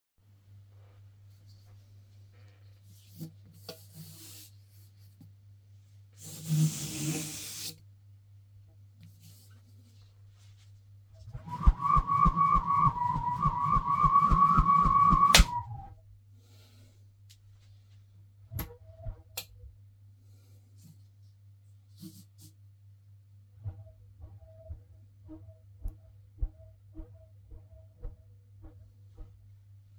Sound effects > Other
Tube Whirl
The weird sound you get when you spin a tube around. It's freaky! Sorry for the heavy breathing, it was a hot day. Recorded on a smart phone with the microphone at one end of the tube and the other end of the tube being whipped around in the stale, hot air of a stifling bedroom. Whirling tube. Yeah, no silly description this time, I'm just, I'm burning alive right now.